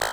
Sound effects > Electronic / Design
RGS-Glitch One Shot 8
Noise, FX, Effect, One-shot, Glitch